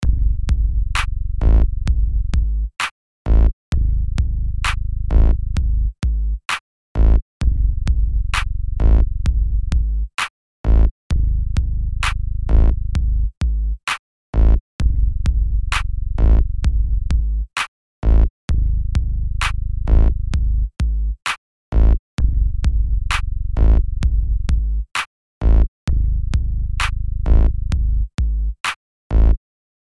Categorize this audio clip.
Music > Multiple instruments